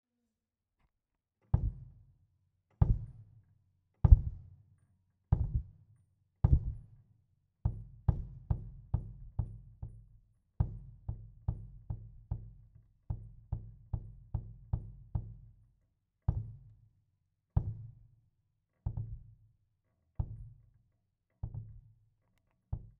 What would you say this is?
Instrument samples > Percussion
MUSCPerc-XY Zoom H4e Kicking kick SoAM Sound of Solid and Gaseous Pt 1
bass-drum, drum, hit, kick, percussion